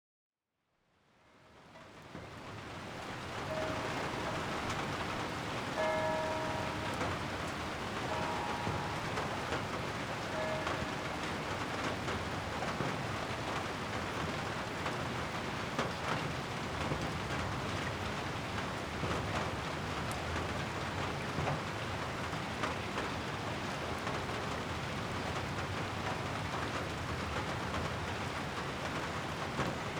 Soundscapes > Nature
Ambient recording of rain and thunder out of an open window in the town. At the beginning you can hear church bells. Due the recording you can hear birds. Zoom F3 Rode NTG 5